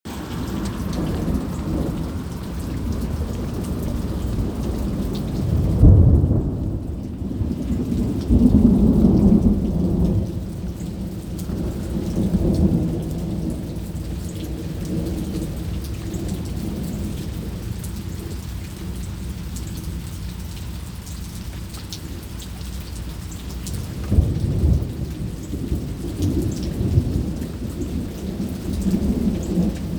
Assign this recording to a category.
Soundscapes > Nature